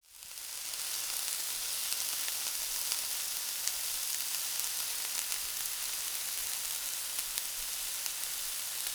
Sound effects > Objects / House appliances
Sizzling food 03
Kitchen cooking sound recorded in stereo.
Cooking, Food, Household, Kitchen